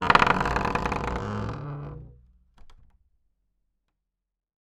Sound effects > Objects / House appliances
Old cave door (opening side) XY - closing 1
Subject : Door sounds opening/closing Date YMD : 2025 04 22 Location : Gergueil France Hardware : Tascam FR-AV2 and a Rode NT5 microphone in a XY setup. Weather : Processing : Trimmed and Normalized in Audacity. Maybe with a fade in and out? Should be in the metadata if there is.
closing, Dare2025-06A, Door, FR-AV2, hinge, indoor, NT5, oneshot, Rode, Tascam, XY